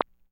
Sound effects > Experimental
Analog Bass, Sweeps, and FX-010
oneshot, scifi, sci-fi, electro, weird, pad, robotic, bassy, machine, effect, dark, bass, mechanical, complex, sample, trippy, fx, electronic, analog, alien, korg, analogue, sweep, snythesizer, vintage, basses, synth, robot, retro, sfx